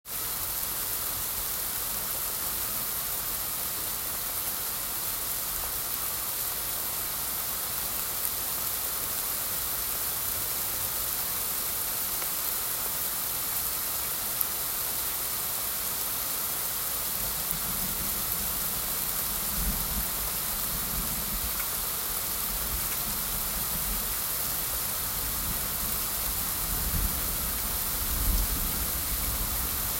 Soundscapes > Nature
Medium Rain 2 - July 2025
Definitely heavier than the last recording, but I wouldn't call this [i]heavy[/i] rain. Either way, still recorded with an iPhone XR.